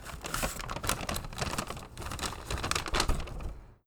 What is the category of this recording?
Sound effects > Objects / House appliances